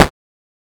Instrument samples > Percussion
8 bit-Noise Percussion11
percussion, game, 8-bit, FX